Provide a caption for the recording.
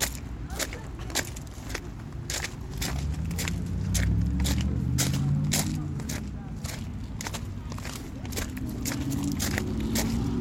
Sound effects > Human sounds and actions
FEETHmn-Samsung Galaxy Smartphone, CU Footsteps, Rocky Surface Nicholas Judy TDC
Footsteps on a rocky surface. One sound in the background was a car engine.
footsteps, rocky